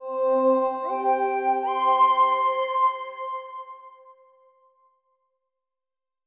Sound effects > Electronic / Design
Angelic Fanfare 2
Simple celebratory melody made with FL Studio / Kontakt / Vocalise.